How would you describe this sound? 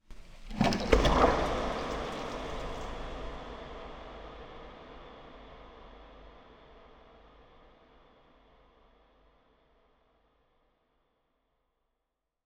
Sound effects > Experimental
A variety of water sounds processed with reverb and other effects, creating a sort of creepy, watery atmosphere.